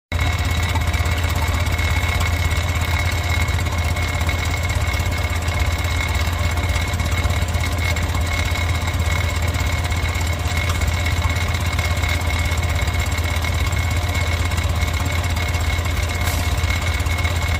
Sound effects > Other mechanisms, engines, machines
Sm Noisy Motor SFX
This sound captures the high-pitch pinging of an agitator motor as it is used in a factory setting.